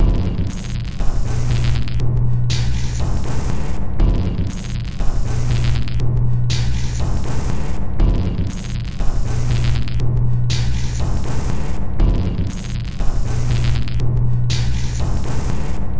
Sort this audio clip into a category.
Instrument samples > Percussion